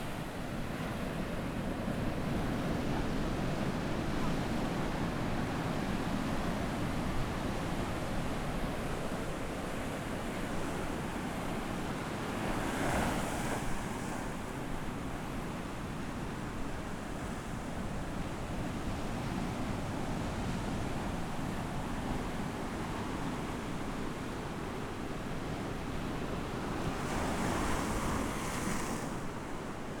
Sound effects > Natural elements and explosions
CapeCoast Ghana Waves2 NK
The immense, powerful sound of strong, crashing waves on Ghana's Cape Coast, where the Gulf Of Guinea - the northernmost part of the tropical Atlantic Ocean - meets the land. This is a similar recording to another file I have uploaded, this one being from a quieter location along the coast. Can be used to perhaps reflect a storm at sea, or the sound of the deep ocean. Recorded on a Tascam DR-05X. 16-bit PCM.
Waves, Current, Atlantic, Sea, Storm, Nature, Strong, Ghana, Water, Field-recording, West-Africa, Gulf, Beach, Natural, Wind, Ocean, Africa, Power